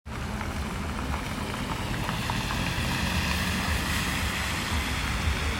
Sound effects > Vehicles
A car passing by from distance on Lindforsinkatu 2 road, Hervanta aera. Recorded in November's afternoon with iphone 15 pro max. Road is wet.
rain, tampere, vehicle